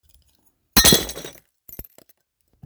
Sound effects > Objects / House appliances
A single smash to a ceramic pot